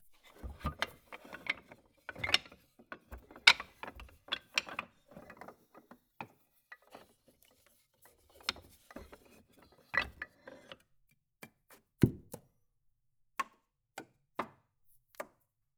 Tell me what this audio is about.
Music > Solo instrument
Sifting Through Loose Marimba Keys Notes Blocks 17
rustle, notes, tink, oneshotes, wood, block, loose, percussion, perc, marimba, woodblock, fx, foley, keys, thud